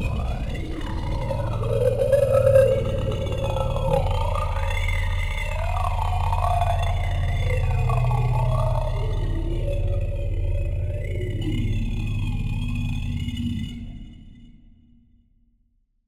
Sound effects > Experimental
Creature Monster Alien Vocal FX-23
Alien, Animal, boss, Creature, Deep, demon, devil, Echo, evil, Fantasy, Frightening, fx, gamedesign, Groan, Growl, gutteral, Monster, Monstrous, Ominous, Otherworldly, Reverberating, scary, sfx, Snarl, Snarling, Sound, Sounddesign, visceral, Vocal, Vox